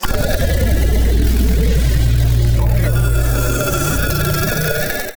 Sound effects > Electronic / Design
Optical Theremin 6 Osc Destroyed-010
Alien, Analog, Bass, Digital, DIY, Dub, Electro, Experimental, FX, Glitch, Glitchy, Handmadeelectronic, Infiltrator, Instrument, Noise, noisey, Optical, Robotic, Sci-fi, Scifi, SFX, Sweep, Theremin, Theremins, Trippy